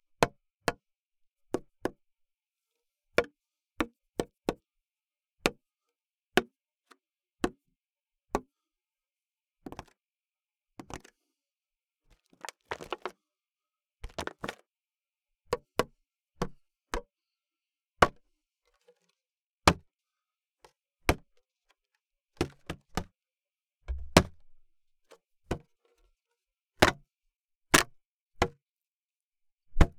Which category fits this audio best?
Sound effects > Objects / House appliances